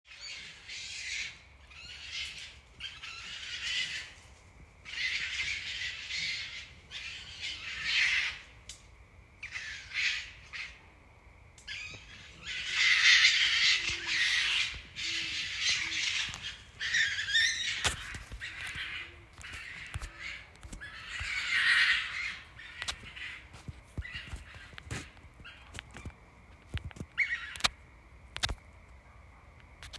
Soundscapes > Nature
Fighting racoons 02/10/2022
Racoons fighting or mating?
animal-sound, night-animal-sound, racoons